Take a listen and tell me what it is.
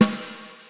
Music > Solo percussion
Snare Processed - Oneshot 225 - 14 by 6.5 inch Brass Ludwig
crack, snaredrum, snareroll, drumkit, snare, realdrums, perc, sfx, brass, drums, oneshot, rimshots, fx, kit, beat, rimshot, roll, acoustic, percussion, snares, processed, hit, rim, flam, reverb, drum, realdrum, ludwig, hits